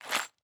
Sound effects > Other mechanisms, engines, machines
Short Shake 02
noise, sample